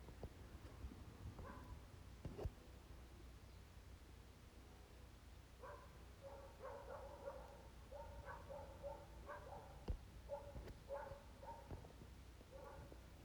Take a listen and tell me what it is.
Sound effects > Animals
ANMLDog dog barking distance DOI FCS2
Dog barking in the distance
BARK, BARKING, DISTANCE, DOG